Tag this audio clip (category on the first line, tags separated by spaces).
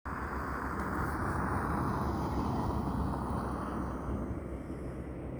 Sound effects > Vehicles
car engine vehicle